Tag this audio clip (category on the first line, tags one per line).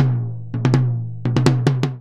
Music > Solo percussion
kit
acoustic
drum
Medium-Tom
quality
Tom
recording
tomdrum
flam
maple
real
roll
realdrum
drums
med-tom
drumkit
beat
perc
loop
percussion
toms
oneshot
wood